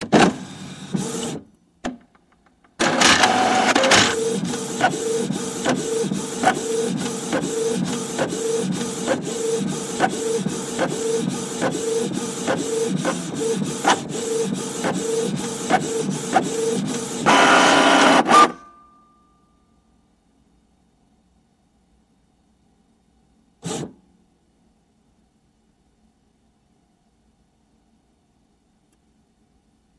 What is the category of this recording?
Sound effects > Objects / House appliances